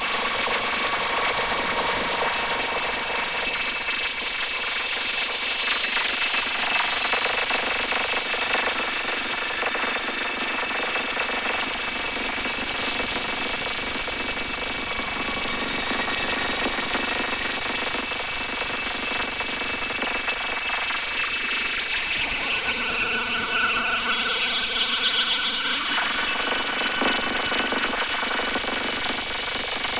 Sound effects > Electronic / Design
Razor Daydream
A sample of our blade sharpener is explored.'Backysrd Blade Sharpener' is the. original audio sample and all other sounds in the pack are derivatives made using granular synthesis and glitch software. This is an abstract noisy sample pack suitable for noise, experimental or ambient compositions.
abstract, ambient, noise, noise-ambient